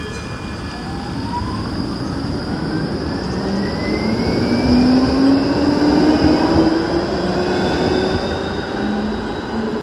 Sound effects > Vehicles
Tram's sound 8
field-recording, city